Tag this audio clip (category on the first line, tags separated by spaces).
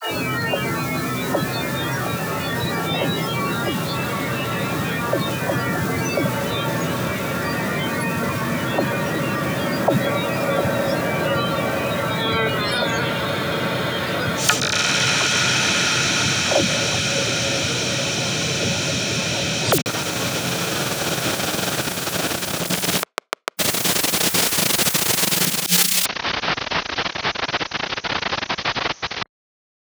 Soundscapes > Synthetic / Artificial
ambient design fly sound weird